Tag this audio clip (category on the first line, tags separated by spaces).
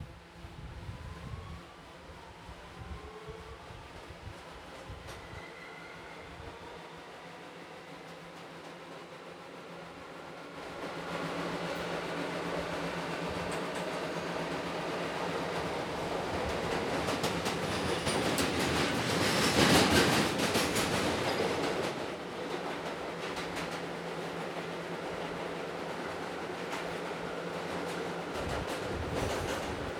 Soundscapes > Urban
nyc train subway still mta